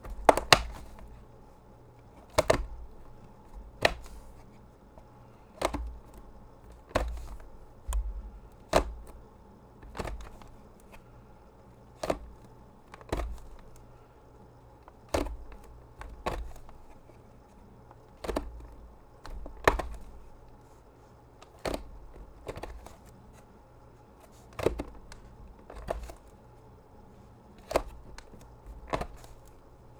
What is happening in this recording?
Sound effects > Objects / House appliances
FOLYProp-Blue Snowball Microphone, CU VHS Clamshell Case, Open, Close Nicholas Judy TDC
A VHS clamshell case opening and closing.
Blue-brand, Blue-Snowball, case, clamshell, close, foley, open, vhs